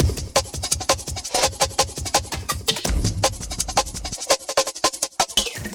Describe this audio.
Music > Multiple instruments
obsidian break
Breakbeat suitable for drum and bass/ambient jungle created in Loopmix - 168bpm
168bpm, breakbeat, dnb, jungle